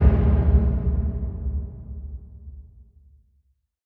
Sound effects > Electronic / Design
DEEP UNDERGROUND BOOM
BASSY, BOOM, CINEMATIC, DEEP, EDITING, EXPLOSION, GRAND, HIT, HUGE, IMPACT, LOW, MOVIE, RATTLING, RUMBLING